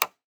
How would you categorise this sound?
Sound effects > Human sounds and actions